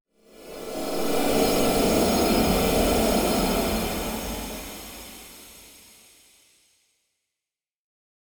Sound effects > Electronic / Design
A simple, reverse magic SFX designed in Reaper with various plugins.